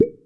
Sound effects > Objects / House appliances
Jar pop
An empty jar being uncorked, making a pop sound
jar, pop, popping